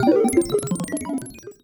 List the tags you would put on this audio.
Sound effects > Other
electronics noise computer electronic glitch digital

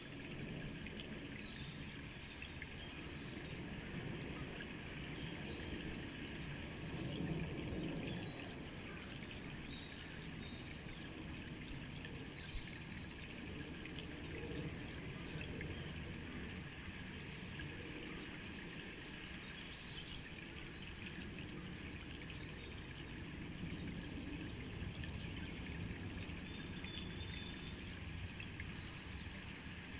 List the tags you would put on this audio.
Soundscapes > Nature
sound-installation Dendrophone weather-data raspberry-pi alice-holt-forest soundscape modified-soundscape nature natural-soundscape data-to-sound phenological-recording field-recording artistic-intervention

